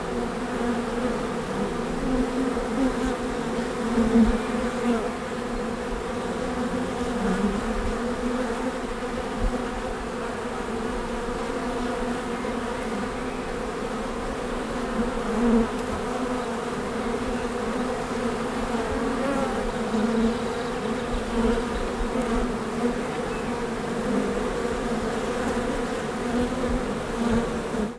Soundscapes > Nature
In the bee box2- swarm of bees stereo
Very close to the beehive... Only got one stitch :-) "sum, sum, sum" Some birds in the background.